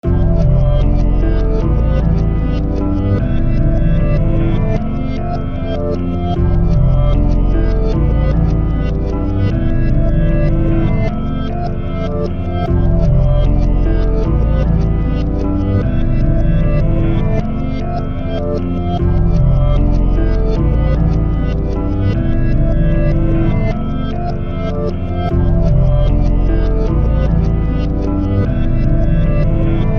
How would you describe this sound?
Music > Solo instrument
Ableton Live. VST......Fury-800.......Reverse loop 152 bpm Free Music Slap House Dance EDM Loop Electro Clap Drums Kick Drum Snare Bass Dance Club Psytrance Drumroll Trance Sample .
152, bpm, loop, Reverse